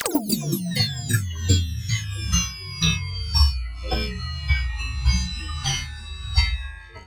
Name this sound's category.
Sound effects > Electronic / Design